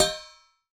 Sound effects > Objects / House appliances
A single-hit of a metal steamer pot with a drum stick. Recorded on a Shure SM57.
metal, single-hit, hit, percussive, metallic, percussion